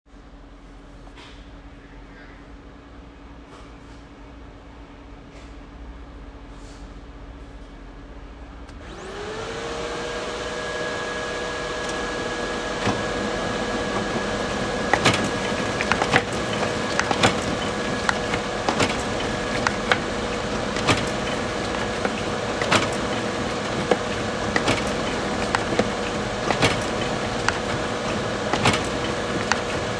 Soundscapes > Indoors
Recorded from my iphone of a toner-based printer at work used to print labels.